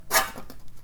Sound effects > Other mechanisms, engines, machines
Handsaw Tooth Teeth Metal Foley 14
foley, fx, handsaw, hit, household, metal, metallic, perc, percussion, plank, saw, sfx, shop, smack, tool, twang, twangy, vibe, vibration